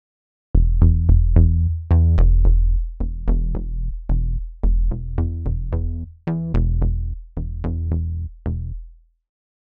Music > Other
Small synth loop
It's a short loop. Maybe useful for larger music piece. Made with Garage Studio. I'd be happy if you tagged me but it isn't mandatory.
abstract, digital, electronic, loop, synthetic